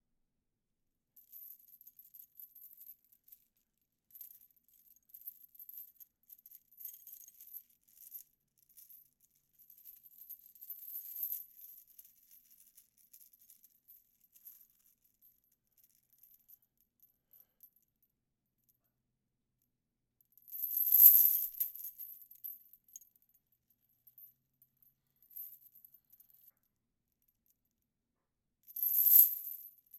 Sound effects > Objects / House appliances
Chain Drop
Small suite of a chain I have falling. A little quiet so you may want to raise the volume
Drop, Metal, Chain